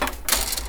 Sound effects > Other mechanisms, engines, machines
metal shop foley -161
sfx, rustle, crackle, tink, bam, tools, percussion, boom, wood, metal, bang, perc, little, thud, bop, foley, strike, sound, oneshot, shop, knock, fx, pop